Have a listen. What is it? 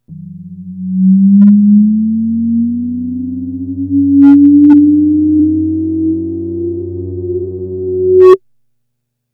Sound effects > Electronic / Design
A weird test sweep created using the Korg EA-1 Modeling Synth and some simple effects. a decent sci-fi sound effect for UFO, or phaser, some sort of alien tone. enjoy~